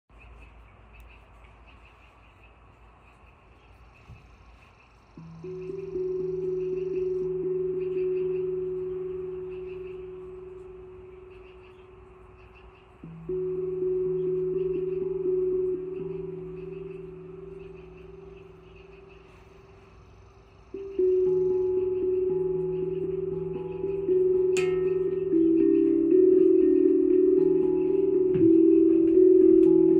Music > Solo instrument
Bird Friends
dreamlike, Experimental, reverb